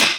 Instrument samples > Percussion
crash Sabian low-pitched 1 puny
Sinocymbal, Zildjian, clang, UFIP, crash, Istanbul, Paiste, sinocrash, shimmer, sizzle, Meinl, boom, hi-hat, metal, smash, low-pitched, crack, cymbal, flangcrash, Soultone, bang, ride, clash, crunch, Stagg, Chinese, China, Sabian, metallic